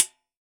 Soundscapes > Other
Washing machine 4s sinesweep SW-IR
An IR Impulse Response of the inside of a washing machine. Speaker on the bottom of the drum, mic on mid-hight motor side. Made by experimenting with a 4s sinesweep. I used a 10€ speaker and a Dji mic 3. Testing that small setup by placing it in a fridge, oven and washing machine. Deconvoluted and then trimmed/faded out in audacity. You can use an IR with a convolution plugin/vst to replicate tones or reverbs/delays. 2025 12 24 Albi France.